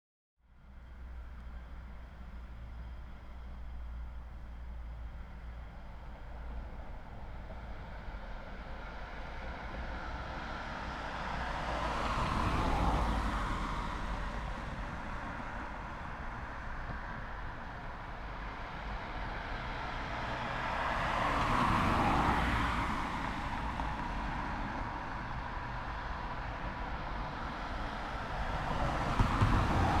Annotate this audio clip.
Soundscapes > Urban
Tascam DR680 Mk2 and two Audio-Technica U851